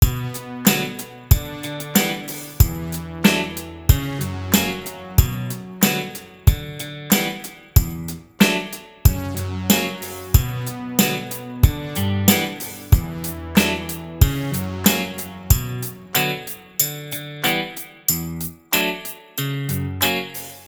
Music > Multiple instruments
Guitar Loop
A loop made with guitar, drums, and a synthesizer. Upbeat, good for background music in a video. 4/4 time signature, B minor key, 93 bpm.
93bpm, Background, beat, Bminor, drum, Guitar, HipHop, synthesizer